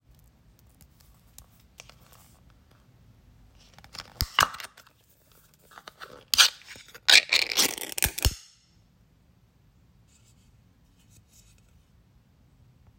Sound effects > Objects / House appliances
Opening Can of Vienna Sausages
A small metal Vienna Sausage can is opened.
metal, can, open, tin, food